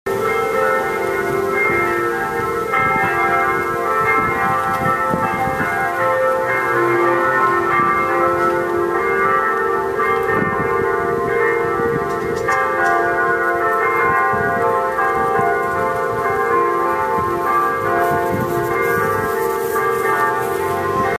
Sound effects > Experimental
Ringing the bells.
Bell, church, religion, Ringing